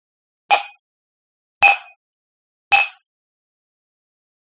Sound effects > Objects / House appliances
mugs-clinking
Ceramic mugs clinking together. Recorded with Zoom H6 and SGH-6 Shotgun mic capsule.